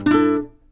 Music > Solo instrument
acoustic guitar pretty chord 7
string, acosutic, dissonant, pretty, chord, guitar, instrument, slap, strings, riff, chords, solo, knock, twang